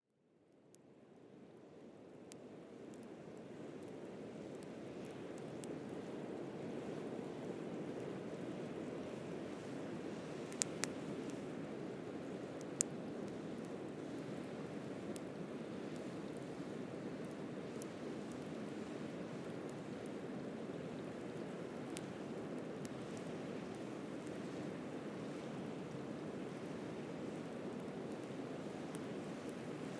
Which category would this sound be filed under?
Soundscapes > Nature